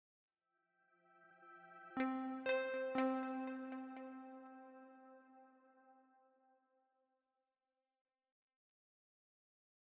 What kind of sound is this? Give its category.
Music > Other